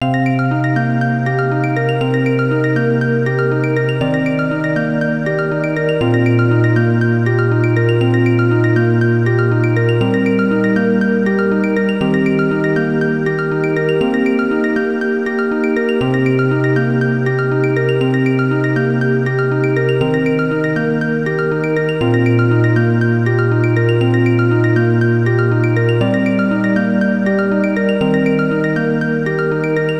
Music > Solo instrument
Piano Loop Fugue Machine 120bpm #001
120bpm, loop, piano, fugue, 120-bpm